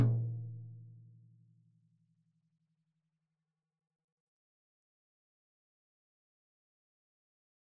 Music > Solo percussion
Med-low Tom - Oneshot 60 12 inch Sonor Force 3007 Maple Rack
Sample from a studio recording at Calpoly Humboldt in the pro soundproofed studio of a medium tom from a Sonor 3007 maple rack drum, recorded with 1 sm57 and an sm58 beta microphones into logic and processed lightly with Reaper